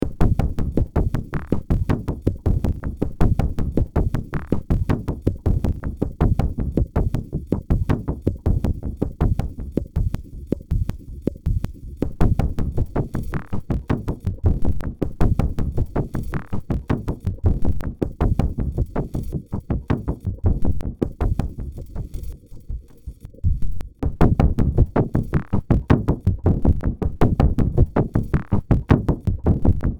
Music > Multiple instruments
Short Track #3822 (Industraumatic)
Cyberpunk, Underground, Industrial, Ambient, Games, Horror, Soundtrack, Sci-fi, Noise